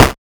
Instrument samples > Percussion
8 bit-Noise Kick1
FX, game, percussion